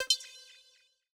Sound effects > Electronic / Design
ANALOG BRIGHT GLOSSY DING
BEEP, BOOP, CHIPPY, CIRCUIT, DING, ELECTRONIC, HARSH, INNOVATIVE, OBSCURE, UNIQUE